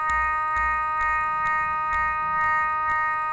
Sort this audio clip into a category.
Soundscapes > Synthetic / Artificial